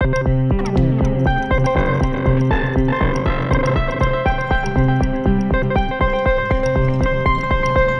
Music > Multiple instruments
Overdriven Piano Loop at 120bpm
Overdriven piano loop with some percussions from the factory samples of Digitakt 2
loop, loopable, rhytm